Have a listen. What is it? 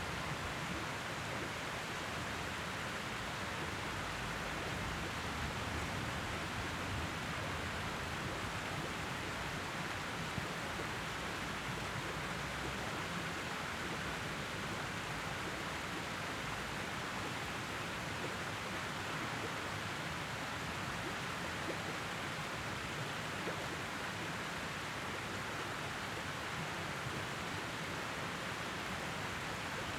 Soundscapes > Urban
ambience fountain city stream
Fontana delle 99 Cannelle, abruzzo, recorded with zoom h6
drip, founta, stream, water